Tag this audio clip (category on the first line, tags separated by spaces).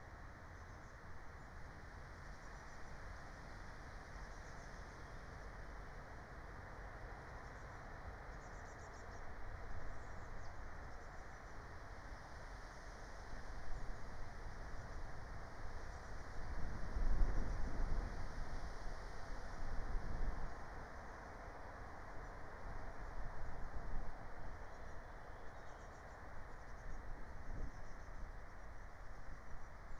Soundscapes > Nature
soundscape nature field-recording phenological-recording